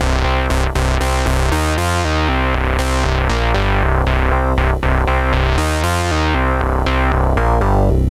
Music > Solo instrument
Synth/bass loops made with Roland MC-202 analog synth (1983)